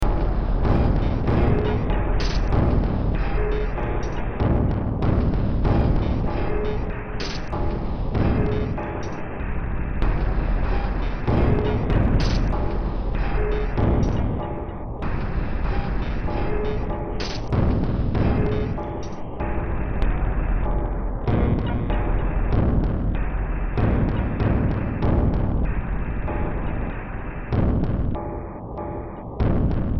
Music > Multiple instruments
Demo Track #3528 (Industraumatic)
Track taken from the Industraumatic Project.